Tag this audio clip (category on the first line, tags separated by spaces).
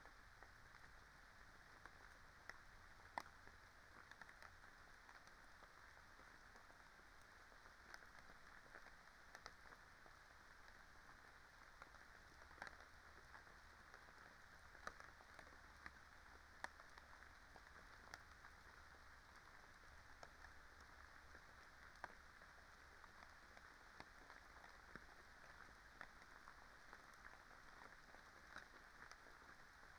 Soundscapes > Nature
modified-soundscape natural-soundscape raspberry-pi